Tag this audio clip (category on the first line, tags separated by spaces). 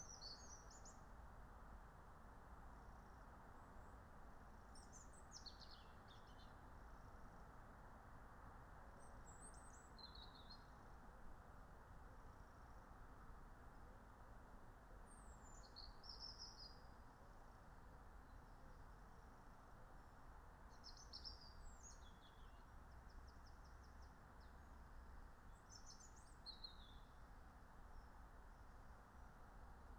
Soundscapes > Nature
raspberry-pi soundscape nature field-recording meadow alice-holt-forest natural-soundscape